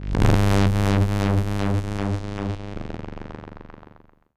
Sound effects > Experimental
Analog Bass, Sweeps, and FX-124
alien, analog, analogue, bass, basses, bassy, complex, dark, effect, electro, electronic, fx, korg, machine, mechanical, oneshot, pad, retro, robot, robotic, sample, sci-fi, scifi, sfx, snythesizer, sweep, synth, trippy, vintage, weird